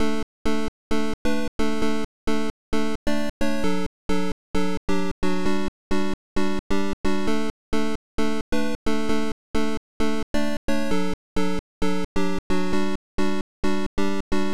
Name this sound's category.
Music > Solo instrument